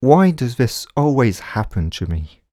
Speech > Solo speech
Sadness - why does this always happen to me
Subject : A mid20s male voice-acting for the first time. Check out the pack for more sounds. Objective was to do a generic NPC pack. Weather : Processing : Trimmed and Normalized in Audacity, Faded in/out. Notes : I think there’s a “gate” like effect, which comes directly from the microphone. Things seem to “pop” in. Also sorry my voice-acting isn’t top notch, I’m a little monotone but hey, better than nothing. I will try to do better and more pronounced voice acting next time ;) Tips : Check out the pack!
voice, Single-take, Male, talk, Video-game, NPC, Man, Sadness, Neumann, Voice-acting, dialogue, singletake, Human, Mid-20s, Vocal, FR-AV2, sad, Tascam, U67, oneshot